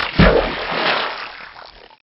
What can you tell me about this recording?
Sound effects > Natural elements and explosions
• I applied Spectralizer on WaveLab 6. • I created brown noise on WaveLab 11 and I made Audition to mimic the envelope of the main waveform. • I applied Restoration on WaveLab 11. • I EQed out the nasty frequencies. • I merged/blended/mixed the original file with the attenuated effect file. The resulting wavefile is NOT superior because the initial waveform was unclearly recoded. The correct thing to do is to ask AI the best microphone for a particular job, then buy a good Chinese clone (the term clone is legally vague; it's not necessarily illegal because it has various interpretations), ask AI how to record correctly the specific thing, and RE-RECORD!